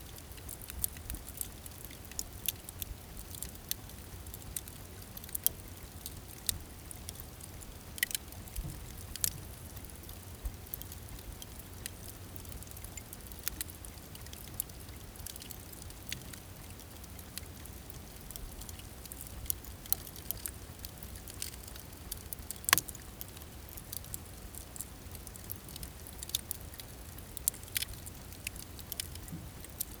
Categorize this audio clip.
Sound effects > Natural elements and explosions